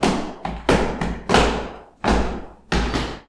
Sound effects > Human sounds and actions
recorded with a zoom box mic.
boom, stairs, thump